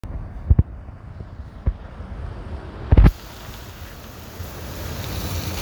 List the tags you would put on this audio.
Soundscapes > Urban
bus
driving
wind

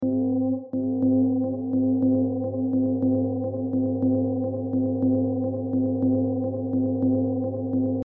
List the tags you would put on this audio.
Sound effects > Electronic / Design
alarm
alert
eerie
effect
fx
half-life
hl2
horror
sci-fi
space
warning

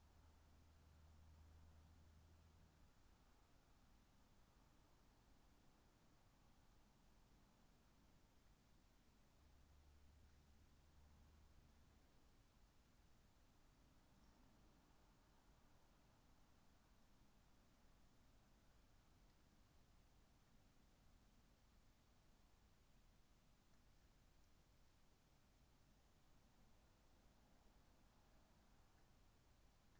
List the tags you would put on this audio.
Soundscapes > Nature
natural-soundscape
artistic-intervention
weather-data
data-to-sound
alice-holt-forest
nature
sound-installation
Dendrophone
field-recording
phenological-recording
soundscape
modified-soundscape
raspberry-pi

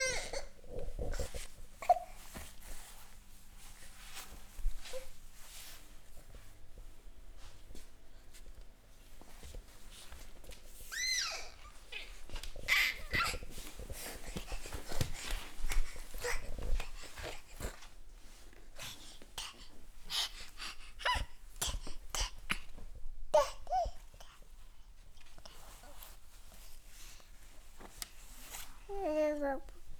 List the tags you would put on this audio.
Speech > Solo speech
babble
babbling
baby
female
infant

